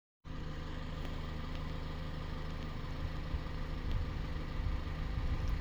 Sound effects > Other mechanisms, engines, machines
clip auto (22)
Auto, Avensis, Toyota